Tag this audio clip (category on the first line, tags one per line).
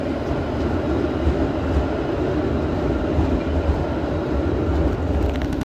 Sound effects > Vehicles
field-recording
Tampere
tram